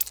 Sound effects > Electronic / Design
TOONSqk-Blue Snowball Microphone Comical, Squeaky, Anime Nicholas Judy TDC
A comical, squeaky anime sound.
Blue-Snowball, squeaky, cartoon, squeak, comical, anime, Blue-brand